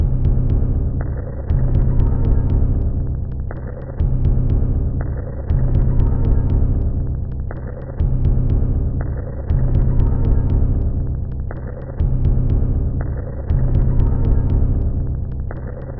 Instrument samples > Percussion
This 120bpm Drum Loop is good for composing Industrial/Electronic/Ambient songs or using as soundtrack to a sci-fi/suspense/horror indie game or short film.
Soundtrack, Weird, Drum, Alien, Dark, Samples, Loopable, Industrial, Ambient